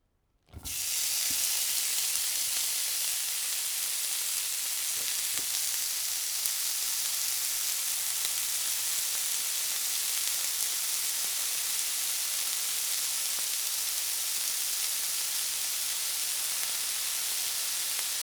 Sound effects > Objects / House appliances
Hot pan sizzle
Stereo recording of food hitting hot pan
food, sizzle, frying